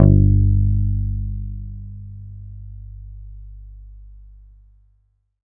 Instrument samples > String
One octave of real bass guitar recorded with a pick. The sound is completely dry and unprocessed, so you can shape it any way you like.